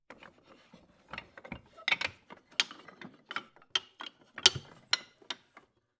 Music > Solo instrument
Sifting Through Loose Marimba Keys Notes Blocks 21
block, foley, fx, keys, loose, marimba, notes, oneshotes, perc, percussion, rustle, thud, tink, wood, woodblock